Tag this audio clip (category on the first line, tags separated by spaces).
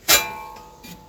Sound effects > Objects / House appliances
banging impact metal